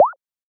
Sound effects > Electronic / Design
Hop SFX
'Boing!' Sounds exactly as described.
boing, bounce, hop, jump, leap, sfx, sound-design, ui